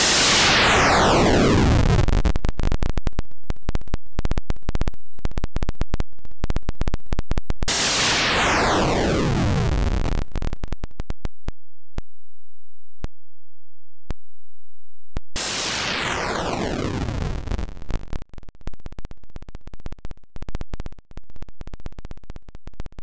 Sound effects > Electronic / Design
three noise sweeps
made in openmpt.